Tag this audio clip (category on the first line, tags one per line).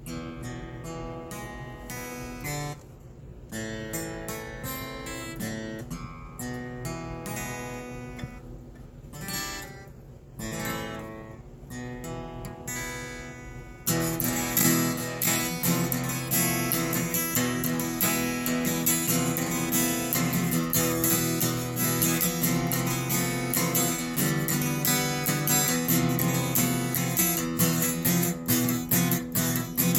Music > Solo instrument
guitar
Phone-recording
rhythm
spain
spanish